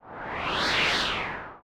Sound effects > Electronic / Design
NOISE SWEEP HIGH
air, flyby, gaussian, jet, pass-by, Sound, swoosh, synth, transition, ui, whip, whoosh